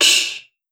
Sound effects > Electronic / Design
Open hat sound I made with my mouth.
Drumkit, Hi-Hat, Open-Hat, Percussion, Sound, Loop, OpenHat, Music, Perc, Hat, Open, Drum, Drums